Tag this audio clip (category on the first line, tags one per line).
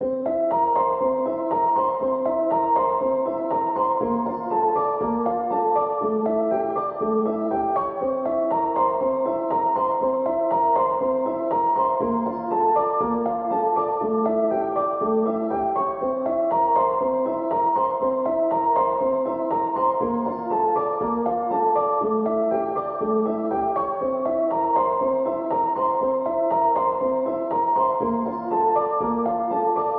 Music > Solo instrument
free
samples
reverb
simple
piano
simplesamples
pianomusic
120bpm
120
loop
music